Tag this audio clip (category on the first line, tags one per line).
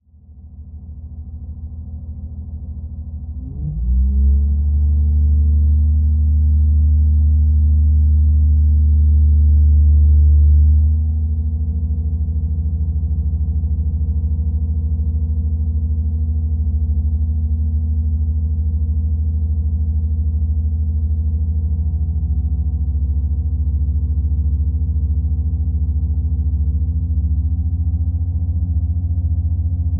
Sound effects > Other mechanisms, engines, machines
train
mic
recording
contact
rumble
field